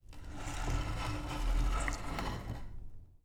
Sound effects > Objects / House appliances
dragging a chair on the ground
dragging, chair, floor